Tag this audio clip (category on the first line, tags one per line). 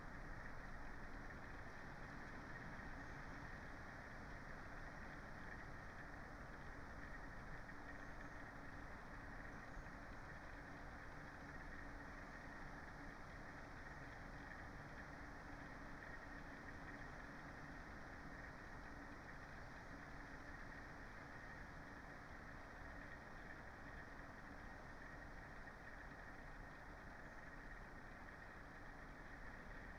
Soundscapes > Nature
data-to-sound
nature
raspberry-pi
soundscape
alice-holt-forest
modified-soundscape
Dendrophone
weather-data
sound-installation
phenological-recording
field-recording
natural-soundscape
artistic-intervention